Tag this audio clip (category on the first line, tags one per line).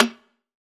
Instrument samples > Percussion
digital
drum
drums
machine
one-shot
physical-modelling
sample
snare
stereo